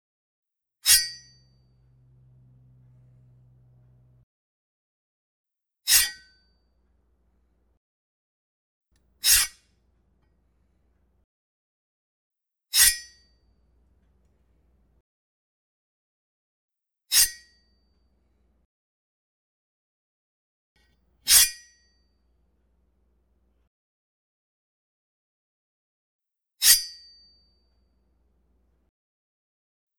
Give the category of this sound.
Sound effects > Objects / House appliances